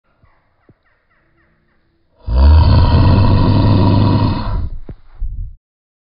Sound effects > Animals
Sasquatch (sound effect) Bigfoot!
my growl slowed to sound like what Bigfoot's might be like